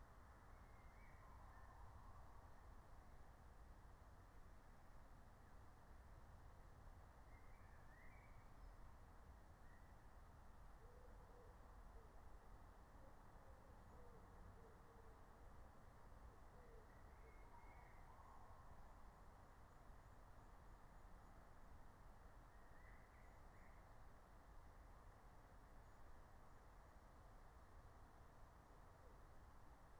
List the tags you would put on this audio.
Soundscapes > Nature
raspberry-pi soundscape alice-holt-forest nature phenological-recording meadow field-recording natural-soundscape

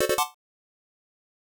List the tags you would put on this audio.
Music > Multiple instruments
8-bit-checkpoint-fanfare 8-bit-victory-fanfare acquire-item-fanfare acquire-item-motif acquire-item-musical checkpoint-fanfare checkpoint-motif checkpoint-musical checkpoint-sound checkpoint-sting get-item-motif get-item-musical halfway-point-sound halfway-point-sting item-get-fanfare musical-acquire-item musical-checkpoint musical-checkpoint-sound musical-get-item reach-checkpoint victory-fanfare video-game-fanfare video-game-victory-fanfare

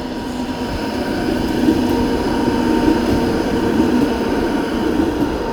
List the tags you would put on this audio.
Sound effects > Vehicles
fast vehicle tram

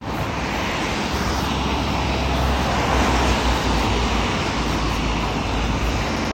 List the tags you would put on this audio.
Sound effects > Vehicles
tire car

